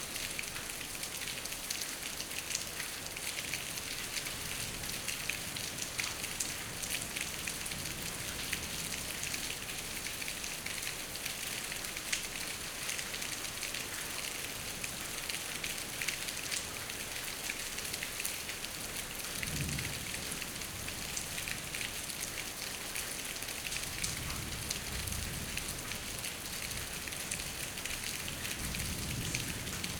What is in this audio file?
Soundscapes > Nature
Recorded indoors near an open door, using a Razer Seiren X and a Macbook Pro.